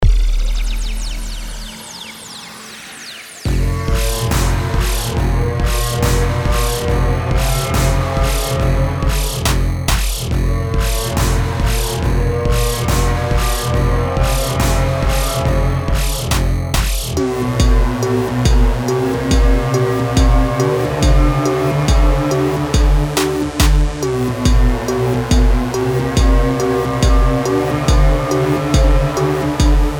Music > Multiple instruments
A dark, haunting sort of beat. All original beats, made using Straylight in Kontakt 8, Metal Rider Kit in Battery, and Gorli Glide and Warper in Reaktor 6. 140 bpm